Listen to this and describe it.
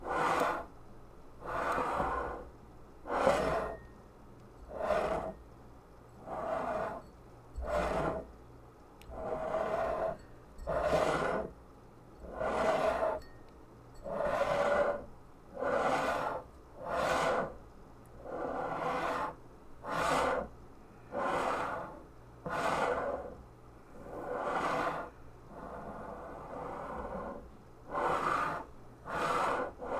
Sound effects > Objects / House appliances
FOODGware-Blue Snowball Microphone Pint Glass, Sliding, Bar Nicholas Judy TDC
A pint bar glass sliding.